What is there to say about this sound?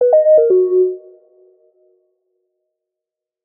Instrument samples > Piano / Keyboard instruments
Notification Sound #2 [NEGATIVE]
A Negative Sounding Notification Sound.
Sci-Fi, Unusual, Strange